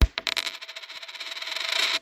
Sound effects > Objects / House appliances
OBJCoin-Samsung Galaxy Smartphone, CU Penny, Drop, Spin 07 Nicholas Judy TDC
drop, foley, Phone-recording, penny, spin